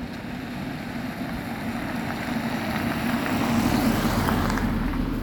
Sound effects > Vehicles
Car passing by at moderate speed (30-50 kph zone) with studded tires on a wet asphalt road. Recorded in Tampere, Finland, in December 2025 in a wet weather with mild wind. May contain slight background noises from wind, my clothes and surrounding city. Recorded using a Samsung Galaxy A52s 5G. Recorded for a university course project.
asphalt-road, car, moderate-speed, passing-by, studded-tires, wet-road